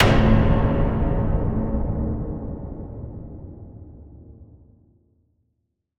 Music > Multiple instruments
Jumpscare (Icy Chill)
cinematic-hit, cinematic-sting, horror-hit, horror-impact, horror-sound, horror-stab, horror-sting, jumpscare, jumpscare-noise, jumpscare-sound, jumpscare-sound-effect, spooky-sound, startled-noise, startling-sound